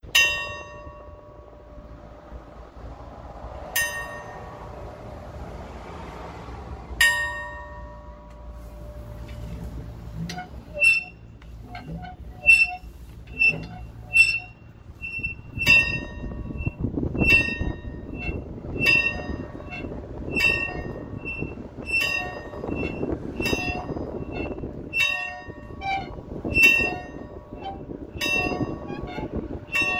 Sound effects > Other mechanisms, engines, machines
BELLLrg-Samsung Galaxy Smartphone, CU Cool Spring Baptist Church, Ringing, Pulley Squeaks Nicholas Judy TDC
A cool spring baptist church bell strikes and pulley squeaks. Distorted and muffled wind noises ahead.
baptist, bell, church, Phone-recording, pulley, ring, squeak